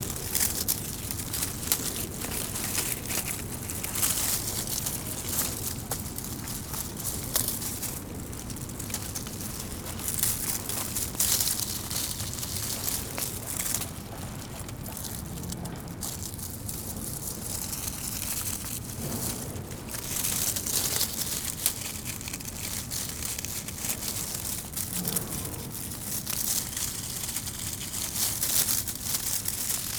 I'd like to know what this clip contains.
Soundscapes > Urban
leaves crunching
Recorded with Tascam DR-07X